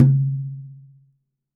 Music > Solo instrument
Mid High Tom Sonor Force 3007-001
Drum, Paiste, Perc, Cymbals, Oneshot, FX, Hat, Kit, Custom, Drums, GONG, Cymbal, Crash, Ride, Sabian, Percussion, Metal